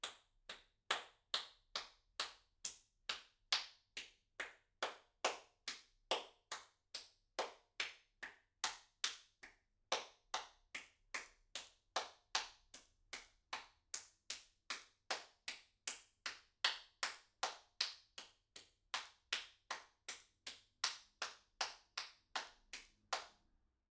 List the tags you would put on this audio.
Sound effects > Human sounds and actions
Applaud
Applauding
Applause
AV2
indoor
Rode
solo

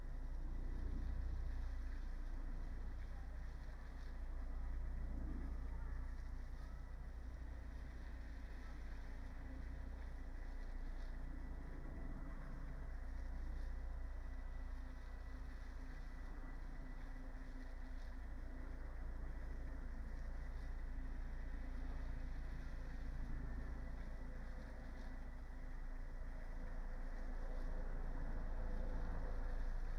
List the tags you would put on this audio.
Soundscapes > Nature
alice-holt-forest
raspberry-pi
data-to-sound
nature

